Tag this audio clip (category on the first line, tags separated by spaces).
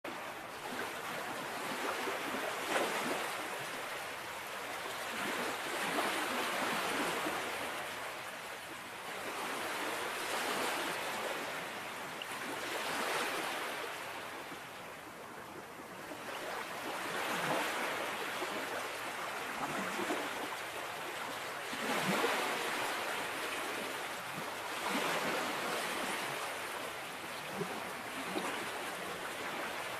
Soundscapes > Nature
Beach Waves Ocean Sea